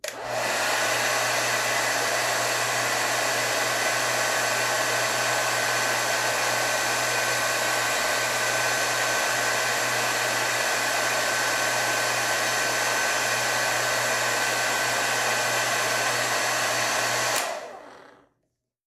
Sound effects > Objects / House appliances
MACHAppl-Samsung Galaxy Smartphone, CU Revlon Hair Dryer, On, Run at Medium Speed, Off Nicholas Judy TDC
A revlon hair dryer turning on, running at medium speed and turning off.
hair-dryer, medium-speed, Phone-recording, run, turn-off